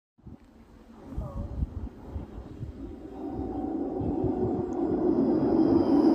Soundscapes > Urban
final tram 8
tram, finland